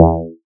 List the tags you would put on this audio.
Instrument samples > Synths / Electronic
fm-synthesis
bass

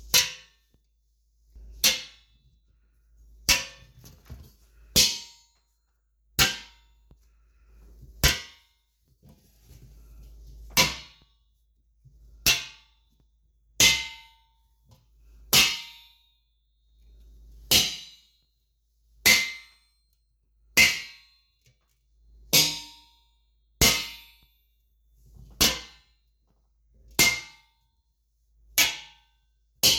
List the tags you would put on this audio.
Sound effects > Objects / House appliances
bullet
hit
impact
metal
Phone-recording

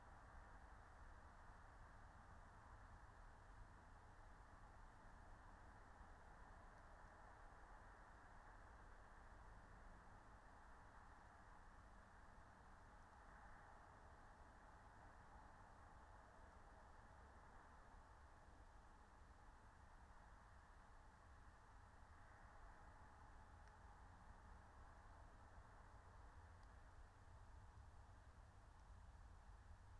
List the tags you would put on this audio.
Soundscapes > Nature
alice-holt-forest
field-recording
meadow
natural-soundscape

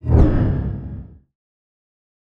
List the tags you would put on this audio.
Sound effects > Other
ambient
audio
cinematic
design
dynamic
effect
effects
element
elements
fast
film
fx
motion
movement
production
sound
sweeping
swoosh
trailer
transition
whoosh

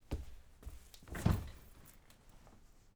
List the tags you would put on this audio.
Sound effects > Objects / House appliances
home
furniture
human
movement
sofa
falling

